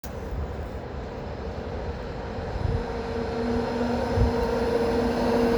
Soundscapes > Urban
A tram passing the recorder in a roundabout. The sound of the tram can be heard. Recorded on a Samsung Galaxy A54 5G. The recording was made during a windy and rainy afternoon in Tampere.